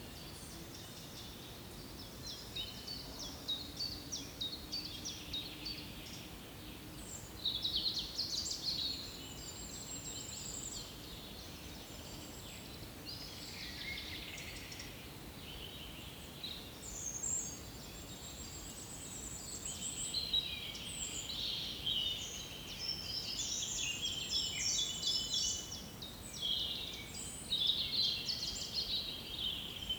Soundscapes > Nature
20250418 10h37-12h00 - Gergueil forest chemin de boeuf
Subject : One of a few recordings from 10h37 on Friday 2025 04 18, to 03h00 the Saturday. Date YMD : 2025 04 18 Location : Gergueil France. "Chemin de boeuf". GPS = 47.23807497866109, 4.801344050359528 ish. Hardware : Zoom H2n MS mode (decoded in post) Added wind-cover. Weather : Half cloudy, little to no wind until late evening where a small breeze picked up. Processing : Trimmed and Normalized in Audacity.
ambiance, ambience, Cote-dor, Forest, nature